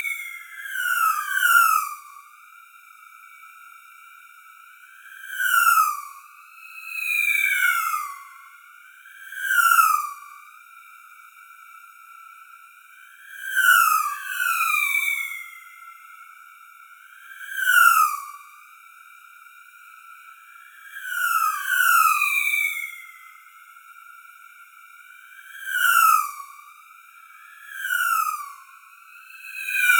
Sound effects > Electronic / Design
Hyper space ships
Spaceships flying by Original recording on Waldorf blofeld
flyby, swish, zippy, swoop, zooming, spacetravel, highfrequency, swoosh, whoosh, spaceship, wind, space, zipping, shrill, ship, zoom, zip